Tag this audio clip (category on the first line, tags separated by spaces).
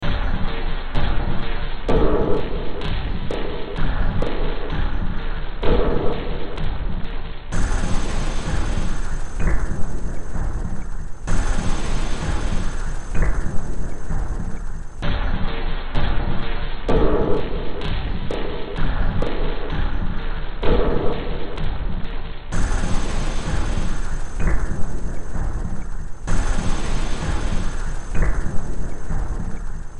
Music > Multiple instruments
Games Underground Industrial Soundtrack Horror Sci-fi Cyberpunk Noise Ambient